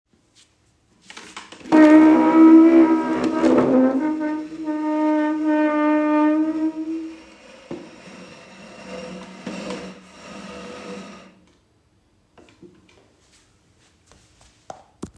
Sound effects > Objects / House appliances
Date and Time: 17/05/2025 9h43 am Venue: Moreira do Lima, Ponte do Lima Sound type: Sound signal Type of microphone used: Iphone 14 omnidirectional internal microphone (Dicafone was the application used) Distance from sound sources: 50cm